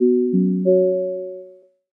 Sound effects > Electronic / Design
A delightful lil chime/ringtone, made on a Korg Microkorg S, edited and processed in Pro Tools.